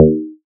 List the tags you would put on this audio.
Instrument samples > Synths / Electronic
bass
fm-synthesis

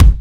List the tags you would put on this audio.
Instrument samples > Percussion
bass-drum
drums
fat-drum
groovy
headsound
kick
mainkick
thrash-metal
trigger

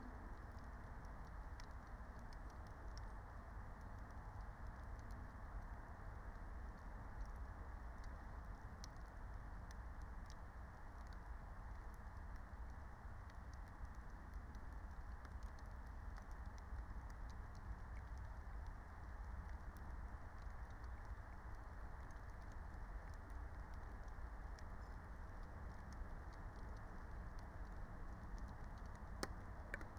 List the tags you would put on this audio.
Soundscapes > Nature
natural-soundscape
alice-holt-forest
phenological-recording
field-recording
soundscape
meadow
raspberry-pi
nature

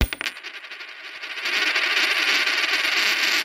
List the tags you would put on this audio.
Sound effects > Objects / House appliances
quarter
Phone-recording
drop

